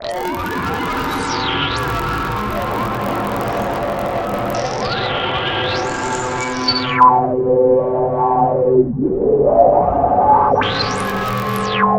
Sound effects > Electronic / Design
Roil Down The Drain 17
cinematic, content-creator, dark-design, dark-soundscapes, dark-techno, drowning, horror, mystery, noise, noise-ambient, PPG-Wave, science-fiction, sci-fi, scifi, sound-design, vst